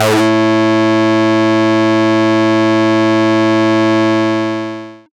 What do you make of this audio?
Instrument samples > Synths / Electronic
Acid Lead One Shoot 2 ( A Note)
Synthed with phaseplant
303, Acid, lead, oneshoot